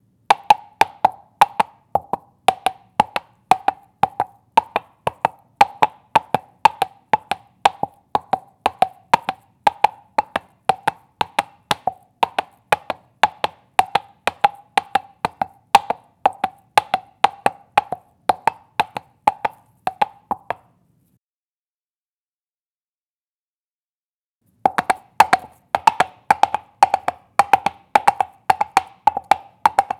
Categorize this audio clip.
Sound effects > Animals